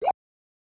Sound effects > Electronic / Design
EXPERIMENTAL ELECTRIC CHIPPY BLOOP
CHIPPY, DING, ELECTRONIC, HARSH, OBSCURE, SHARP, SYNTHETIC, UNIQUE